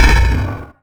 Instrument samples > Percussion
whoosh 1 long
aliendrum aliendrums alienware exoalien non-Mexican-alien UFOs-don't-speak-Spanish snare fake whoosh rush blast breeze burst dart dash flap flash fly flutter gale gasp gust hurry roar shoot sigh sough sprint swish whiz zoom aliensnare fakecrash junk spaceship spaceshit fakery junkware weird uncanny eerie unnatural preternatural supernatural unearthly other-worldly unreal ghostly mysterious mystifying strange abnormal unusual eldritch creepy spooky freaky rum odd bizarre peculiar quirky surreal atypical unorthodox unconventional extraordinary uncommon outlandish
sprint, burst, dash, gasp, whiz, flash, alienware, rush, aliendrum, shoot, non-Mexican-alien, gust, aliendrums, hurry, blast, sigh, gale, whoosh, UFOs-dont-speak-Spanish, fly